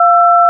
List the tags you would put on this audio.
Sound effects > Electronic / Design
telephone retro dtmf

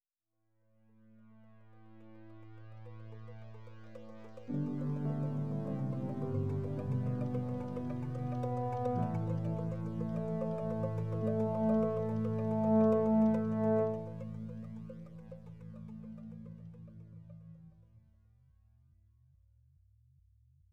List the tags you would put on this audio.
Music > Multiple instruments
107bpm Brass Connective Dark Mandolin Piano Rhodes Sound-design Sting Strings Thoughtful Transition

